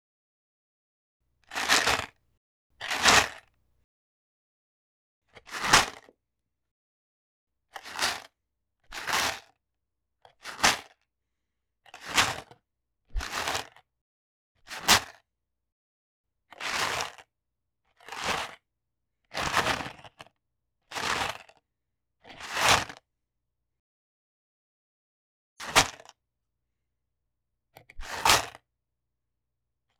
Sound effects > Objects / House appliances
reese's pieces candy movement shake 01032026
recording of recess peanuts movement in a box sounds. they can work well for gravel or rock impact sounds.
sweet, chew, reese, peanuts, moving, movement, candy, shaked, waggle, reeses, shaking, coco, choco, delicious, chocolate, foley, sweets